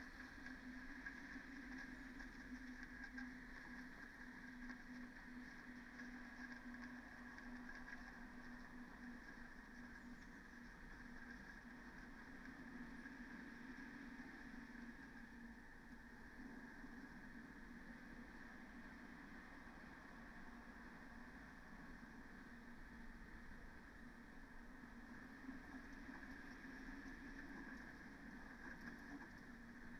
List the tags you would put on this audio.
Soundscapes > Nature
sound-installation
nature
soundscape
natural-soundscape
Dendrophone
field-recording
raspberry-pi
alice-holt-forest
data-to-sound
modified-soundscape
artistic-intervention
weather-data
phenological-recording

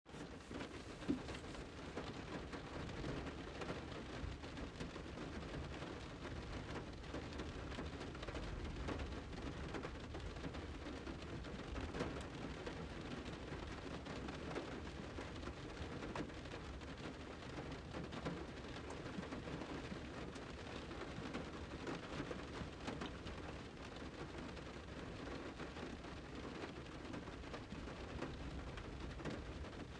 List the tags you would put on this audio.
Soundscapes > Nature
storm
rainstorm
car
thunder
nature
thunderstorm
field-recording
rain

